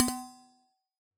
Sound effects > Objects / House appliances
Resonant coffee thermos-029
percusive, sampling